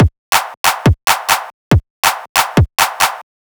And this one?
Music > Solo percussion
Classic BrazilFunk DrumLoop
All samples just used flstudio original sample pack. Processed with Waveshaper and Fruity Limiter
Brazilfunk, Brazilian, BrazilianFunk, Festival, Loop, Percussion